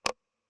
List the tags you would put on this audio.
Sound effects > Electronic / Design
game interface ui

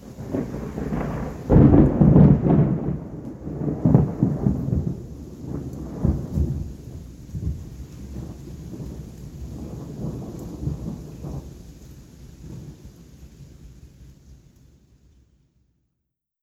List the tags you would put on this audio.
Sound effects > Natural elements and explosions
sharp Phone-recording crackle thunder

